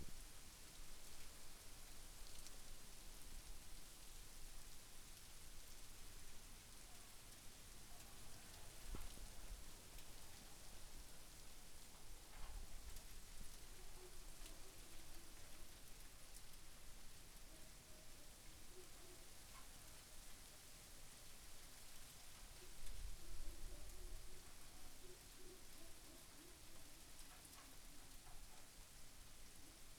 Soundscapes > Nature
Ambience Outdoor WetSnow
cold, wet, slush, soundscape, atmosphere, ambient, background, footsteps, weather, nature, field-recording, soft, winter, snow